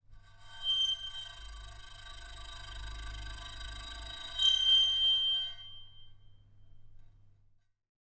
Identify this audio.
Sound effects > Other
Bowing metal newspaper holder with cello bow 8
Bowing the newspaper holder outside our apartment door. It's very resonant and creepy.
atmospheric, bow, eerie, effect, fx, horror, metal, scary